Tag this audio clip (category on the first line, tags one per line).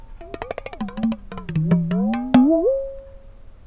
Sound effects > Objects / House appliances
stab
hit
industrial
mechanical
foley
percussion
sfx
oneshot
clunk
fieldrecording
fx
glass
bonk
drill
perc
object
natural
foundobject
metal